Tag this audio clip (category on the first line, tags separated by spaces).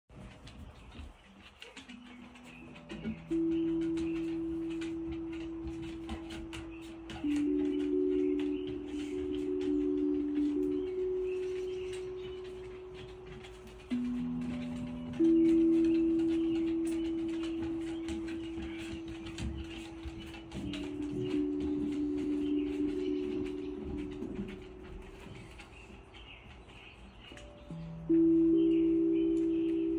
Music > Solo instrument
Dreamscape echo reverb